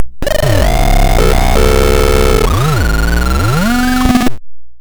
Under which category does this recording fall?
Sound effects > Electronic / Design